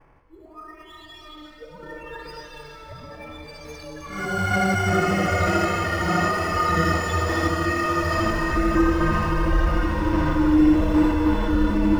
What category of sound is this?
Sound effects > Electronic / Design